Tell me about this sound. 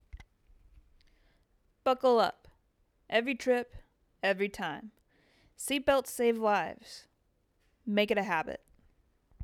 Speech > Solo speech
Quick PSA reminding everyone about the life-saving importance of wearing a seatbelt. Script: "Buckle up — every trip, every time. Seatbelts save lives. Make it a habit."